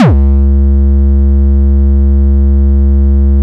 Instrument samples > Percussion
8 bit-808 Kick 2
8-bit, FX, game, percussion